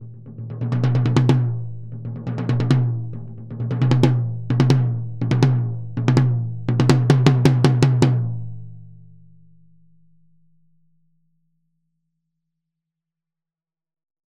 Music > Solo percussion
med low tom-fill 3 12 inch Sonor Force 3007 Maple Rack
tomdrum, drum, recording, Medium-Tom, drumkit, med-tom, oneshot, loop, realdrum, perc, real, acoustic, beat, toms, flam, wood, roll, drums, kit, percussion, Tom, maple, quality